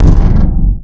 Instrument samples > Percussion
stereophonize/-se: 1. to make a monophonic waveform stereophonic, 2. to boost/expand the stereophony (via various phase and spectral techniques)
debris, dump, landfill, receptacle, skip